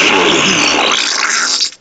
Sound effects > Human sounds and actions
Wet Fart
My pants feel awkward now kinda wet I don't know why.
Butt, Fart, Poopoo, SFX, stinky, Wet